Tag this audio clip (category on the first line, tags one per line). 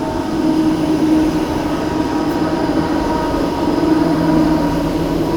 Sound effects > Vehicles
rails
tram
vehicle